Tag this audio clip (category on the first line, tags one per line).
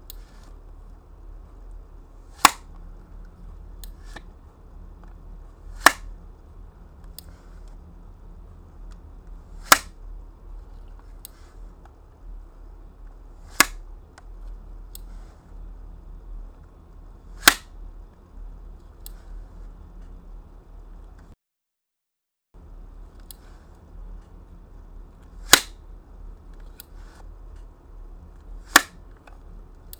Sound effects > Objects / House appliances
Blue-brand
Blue-Snowball
close
lantern
lift
light
luminar
open
outdoor